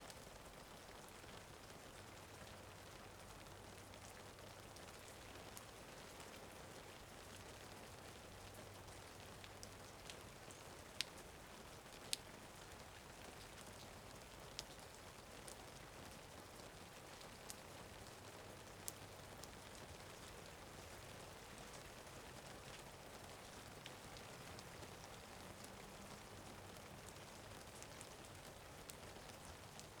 Sound effects > Natural elements and explosions
Rain falling on a porch. Large raindrops dripping. Rain is medium-hard. Recorded with the AT875R Stereo microphone on a Zoom H6